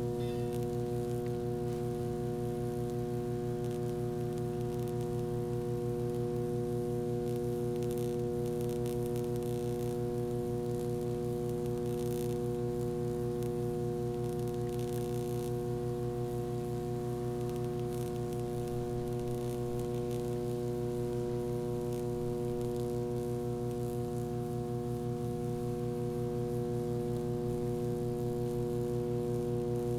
Soundscapes > Other
Acoustic Emission from a 500 kV Reactor Bank - Power Substation
Acoustic Emissions from Reactor Bank in a Power Substation (500 kV - 60 Hz). With low noise of Corona Discharge. - Recording date: May 13, 2025 - 15:30. - Recorder: Zoom, model H1N - Barreiras, State of Bahia, Brazil Citation: ARAUJO, R. L., Reactor Bank in a 500 kV Power Substation. Barreiras, Brazil.
Acoustic, Corona, Discharge, Electric, Power, Reactor